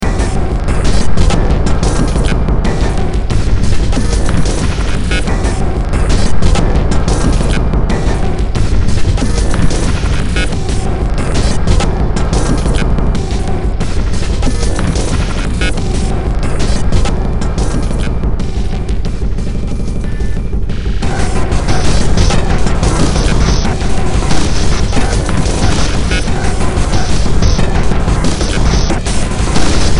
Music > Multiple instruments
Demo Track #3982 (Industraumatic)
Horror, Soundtrack, Ambient, Sci-fi, Underground, Games, Cyberpunk, Industrial, Noise